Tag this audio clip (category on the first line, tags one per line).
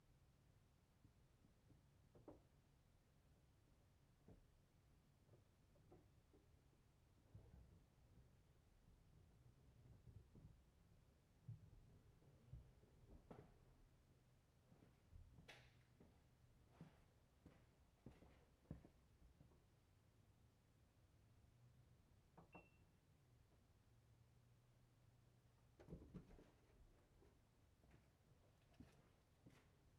Soundscapes > Other
automobile
car
CarEngine
drive
engine
motor
vehicle